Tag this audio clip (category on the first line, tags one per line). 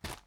Sound effects > Objects / House appliances
Rustle
Crunch
PaperBag
Foley